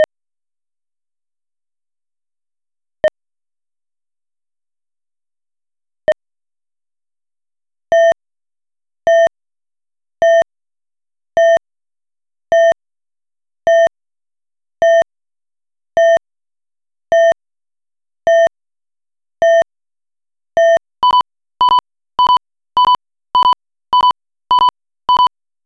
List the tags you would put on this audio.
Sound effects > Electronic / Design
crossing
pedestrian
alarm
crosswalk
blind
traffic
beep
traffic-lights

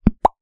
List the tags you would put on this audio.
Sound effects > Human sounds and actions
pop
mouth
popping
UI
lips

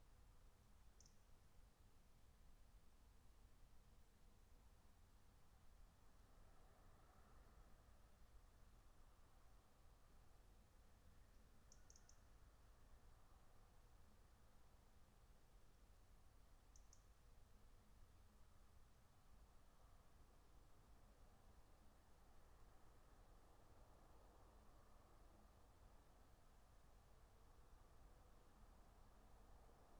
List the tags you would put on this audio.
Soundscapes > Nature
alice-holt-forest
field-recording
nature
raspberry-pi

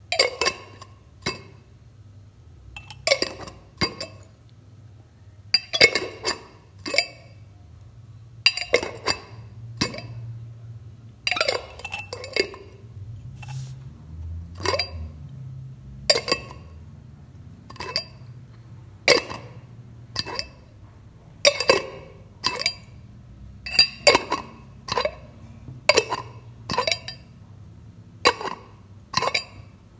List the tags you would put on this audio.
Sound effects > Objects / House appliances
Sierra
field
jar
de
recording
Bolivia
la
South
Santa
Cruz
percussion
America

